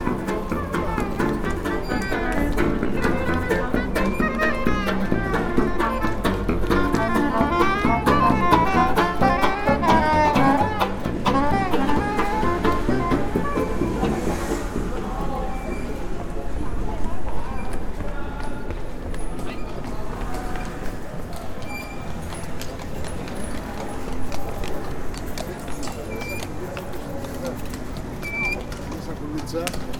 Soundscapes > Urban
Street musicians and trolley horse in city alley
Recorded in Florence using TASCAM DR-05XP
field-recording, musicians, crowd, horse